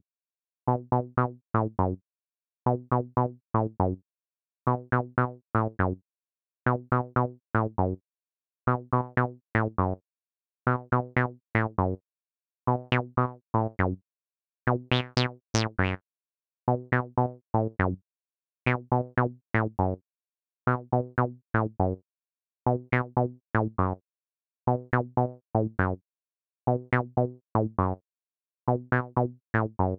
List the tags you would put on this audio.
Music > Solo instrument
303 Acid electronic hardware house Recording Roland synth TB-03 techno

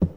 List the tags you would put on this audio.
Sound effects > Objects / House appliances
object foley clatter lid clang container scoop spill tip bucket plastic metal tool drop pour shake liquid pail slam debris carry fill cleaning

